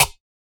Sound effects > Objects / House appliances
Fountainpen Draw 3 Flick
Drawing on notebook paper with an ink fountain pen, recorded with an AKG C414 XLII microphone.
drawing, writing